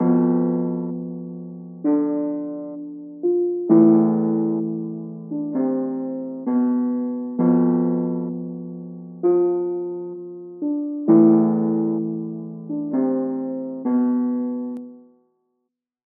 Music > Solo instrument
Piano Loop 130bpm 8bars
Made with FL Keys and Halftime Plugin